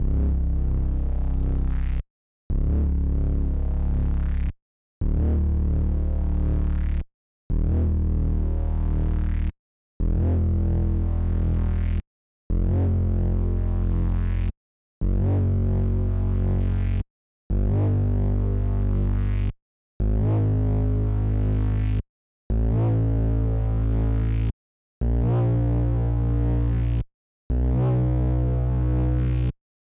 Music > Solo percussion
Bass made from UAD Opal synth